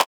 Instrument samples > Synths / Electronic
A growly, short one-shot made in Surge XT, using FM synthesis.
electronic, fm, surge, synthetic